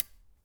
Sound effects > Other mechanisms, engines, machines
metal shop foley -172
crackle, fx, knock, perc, percussion, tink